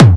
Instrument samples > Percussion
Techno-Hard Kick 2
Synthed with 3xOsc only. Processed with Camel Crusher, Waveshaper, ZL EQ, Fruity Limiter.
Kick, Acidtechno, Techno, Hard